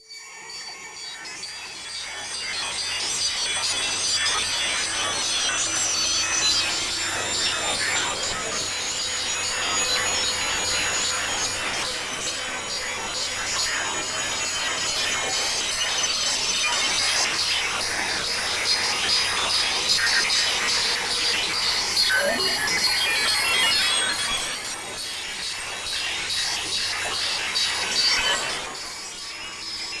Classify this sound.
Sound effects > Electronic / Design